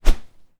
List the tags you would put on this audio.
Sound effects > Objects / House appliances
FR-AV2 Rode swinging Fast Plastic swing Airy Transition Whoosh coat-hanger NT5 SFX Hanger Tascam

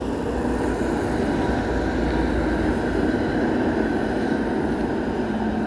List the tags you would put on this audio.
Sound effects > Vehicles
Tampere tram vehicle

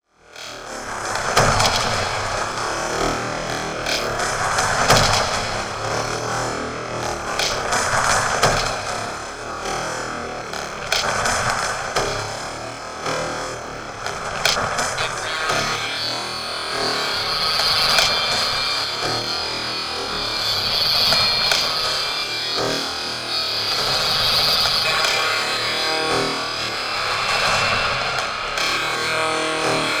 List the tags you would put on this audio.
Sound effects > Experimental
apophenia Grassi MakeNoise pareidolia rungler spectral-synthesis Spectraphon touchplate-controller vocal

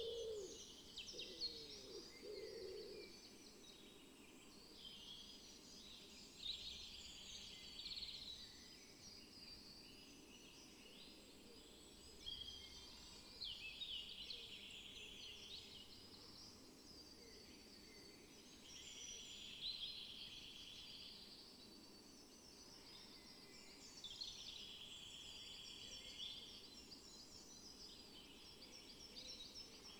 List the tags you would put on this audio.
Soundscapes > Nature
field-recording natural-soundscape soundscape

